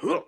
Sound effects > Human sounds and actions
jump grunt 01
3 different types of jumps grunts recored by me using the sm58 shure can be used in short fillm, games,and more
grunt, male, voice